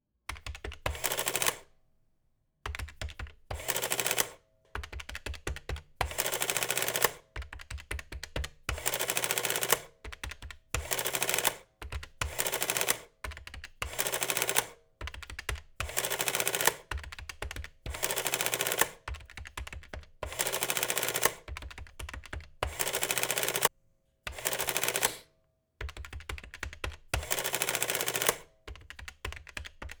Sound effects > Objects / House appliances
A TI 5045II electric calculator being used to generate a paper tape. Minimal processing mostly minor noise reduction and level balancing. Lots of data entry on keypad, so edit out the number of entries you want and then add a paper rip to the end if desired. You can find paper rips at: #2:13 #2:56 #2:59 #3:04 Recorded on Zoom F6 with AT2020 microphone.
adding, Calculator, machine